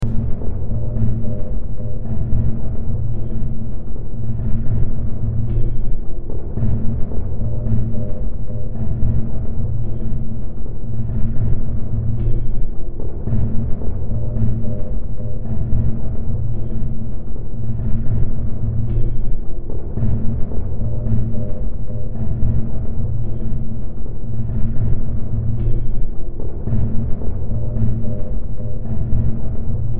Soundscapes > Synthetic / Artificial
Looppelganger #190 | Dark Ambient Sound
Use this as background to some creepy or horror content.
Darkness, Drone, Survival, Games, Hill, Noise, Ambience, Silent, Horror, Underground, Soundtrack, Ambient, Gothic, Weird, Sci-fi